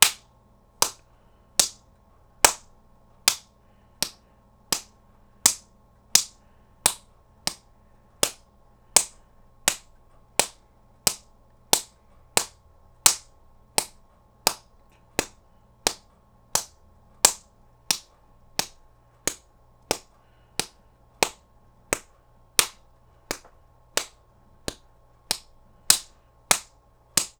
Sound effects > Human sounds and actions
FGHTImpt-Blue Snowball Microphone, CU Smacks, Hand Nicholas Judy TDC
Blue-brand, Blue-Snowball, fight, foley, hand, smacks